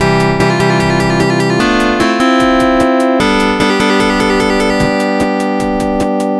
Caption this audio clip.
Music > Multiple instruments
A piano loop i made in furnace. The instruments in this loop (piano and drum-set) i just learned to make, so.... have fun!
FM, Loop